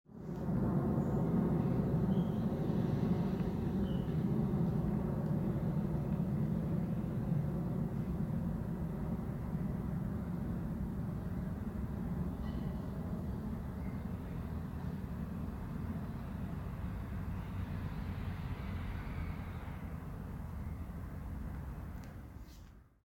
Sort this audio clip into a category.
Soundscapes > Urban